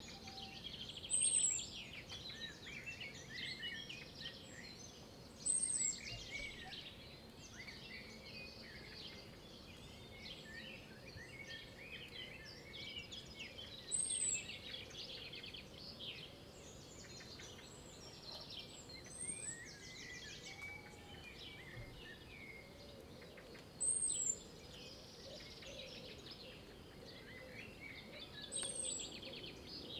Soundscapes > Nature
common birds in britany spring countryside 2
Birds from june 2023, in the afternoon just after a rain. Robin (rouge gorge), blackcap (fauvette a tete noire), blackbird (merle), wren (troglodyte mignon) commun chiffchaff (pouillot véloce), wood pigeon (pigeon ramier), chaffinch (pinson), moineau (sparrow)...according to Merlin Bird Id.
bird, birds, birdsong, Britany, countryside, field-recording, France, nature, plane, robin, spring